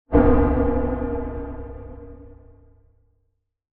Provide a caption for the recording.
Sound effects > Electronic / Design
PROFOUND LONG NETHER HIT
BASSY, BOLHA, BOOM, BRASIL, BRASILEIRO, BRAZIL, BRAZILIAN, DEEP, EXPLOSION, FUNK, HIT, IMPACT, LOW, MANDELAO, PROIBIDAO, RATTLING, RUMBLING